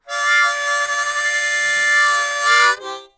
Instrument samples > Wind
Harmonica Trill
sound of a short musical trill on a harmonica recorded into logic with and sm57 via a scarlet 2i2 interface
cowboy, harmonica, music, western